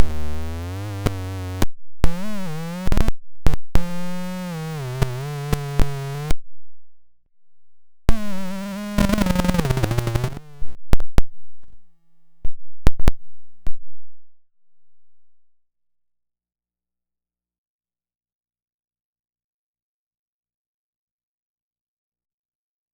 Sound effects > Electronic / Design
Optical Theremin 6 Osc dry-114
Robotic, Digital, Dub, Trippy, Alien, noisey, Handmadeelectronic, Analog, Sci-fi, Robot, Theremins, Bass, Glitchy, Optical, Experimental, DIY, Infiltrator, Electronic, Sweep, Electro, FX, Theremin, Instrument, Synth, Noise, Scifi, SFX, Glitch, Spacey, Otherworldly